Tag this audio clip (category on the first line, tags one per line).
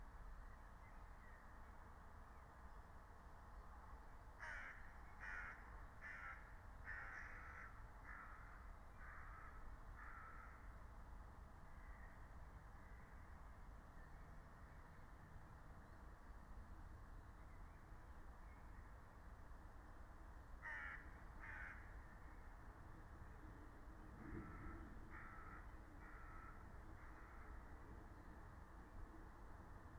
Soundscapes > Nature
nature
natural-soundscape
phenological-recording
meadow
soundscape
field-recording
raspberry-pi
alice-holt-forest